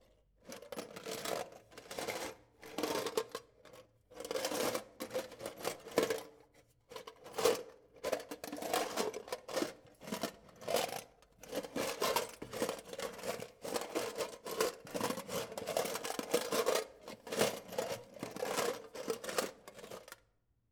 Sound effects > Objects / House appliances
rotating a metal box with metallic objects inside
Rotating a rusty, metallic tea box, with smaller metallic objects inside. Recorded with Zoom H2.